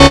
Instrument samples > Synths / Electronic
DRILLBASS 8 Db
fm-synthesis, bass